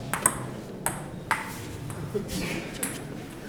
Soundscapes > Indoors
Playing Ping Pong and laughing Sound recorded while visiting Biennale Exhibition in Venice in 2025 Audio Recorder: Zoom H1essential